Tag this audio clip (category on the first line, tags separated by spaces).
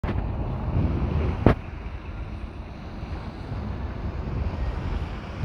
Soundscapes > Urban
bus driving engine